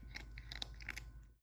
Sound effects > Animals
FOODEat-Samsung Galaxy Smartphone Cat Eating Dry, Crunchy Food Nicholas Judy TDC
A cat eating dry, crunchy food.
animal, cat, crunch, crunchy, dry, eat, foley, food, Phone-recording